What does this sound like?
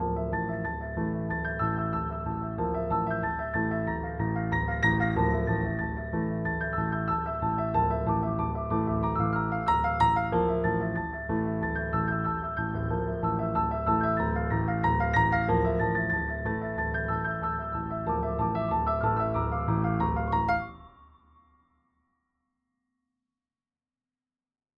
Music > Solo instrument
Piano Spy Journey in D 93bpm
a cinematic piano loop created using Kontakt and FL Studio
adventurous, ambiant, beat, chill, cinematic, composition, dramatic, dynamic, key, keyloop, keys, loop, loopable, movie, music, orchestral, piano, rhythm, soundtrack, sountracks, theater, theme, tragedy, trailer